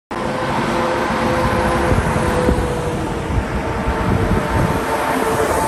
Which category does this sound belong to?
Sound effects > Vehicles